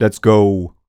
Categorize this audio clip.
Speech > Solo speech